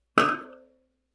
Sound effects > Objects / House appliances
aluminum can foley-018
alumminum, can, foley, fx, household, metal, scrape, sfx, tap, water